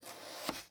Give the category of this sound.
Sound effects > Other